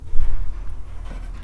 Sound effects > Other mechanisms, engines, machines
Woodshop Foley-092
strike, bop, fx, thud, tools, knock, oneshot, little, crackle, sfx, perc, tink, percussion, bam, bang, shop, rustle, wood, sound, boom, foley, metal, pop